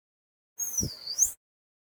Sound effects > Human sounds and actions
me whistling in front of the camera mic